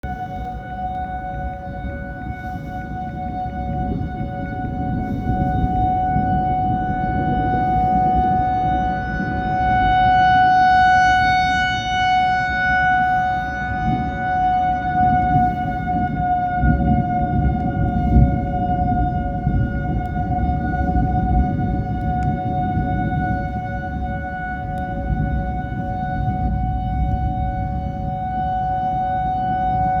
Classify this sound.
Soundscapes > Nature